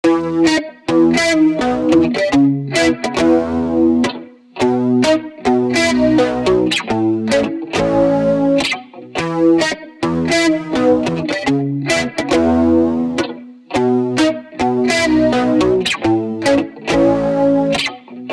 Instrument samples > Other
Ableton Live. VST.Purity......Guitar 105 bpm Free Music Slap House Dance EDM Loop Electro Clap Drums Kick Drum Snare Bass Dance Club Psytrance Drumroll Trance Sample .
105, Bass, bpm, Clap, Drum, Drums, EDM, Electro, Free, Kick, Loop, Music, Slap, Snare